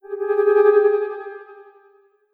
Sound effects > Electronic / Design
Videogame SFX 3
2 flute notes, at semitone distance, with tremolo effect
scary, tremolo